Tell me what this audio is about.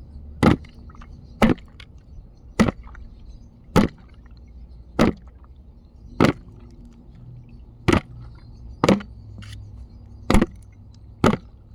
Sound effects > Human sounds and actions
Hitting Two Paint Cans Together
Two plastic paint buckets banging against each other recorded on my phone microphone the OnePlus 12R
bang, can, slosh